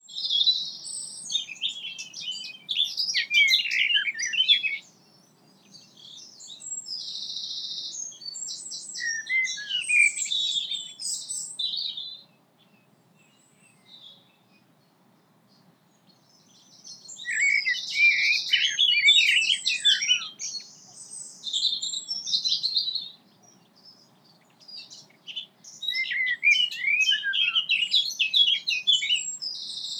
Soundscapes > Nature
An recording from RSPB Langford Lowfields. Edited using RX11.